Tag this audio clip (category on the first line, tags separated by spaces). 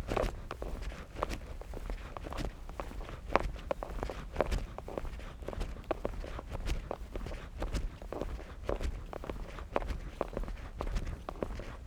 Sound effects > Human sounds and actions
handheld Mono Pouch Tascam Urbain walk Wind-cover WS8